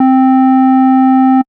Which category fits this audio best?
Instrument samples > Synths / Electronic